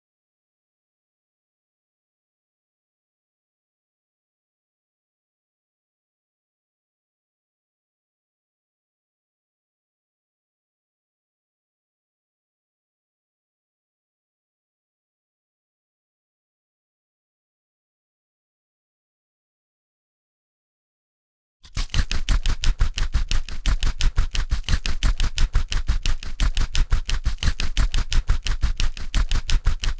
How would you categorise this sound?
Music > Solo percussion